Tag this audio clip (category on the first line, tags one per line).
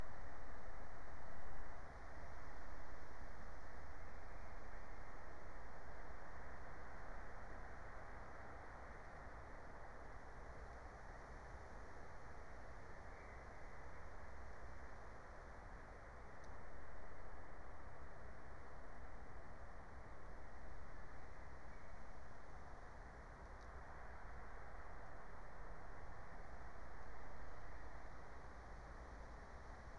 Soundscapes > Nature
meadow
alice-holt-forest
natural-soundscape
nature
field-recording
raspberry-pi
soundscape
phenological-recording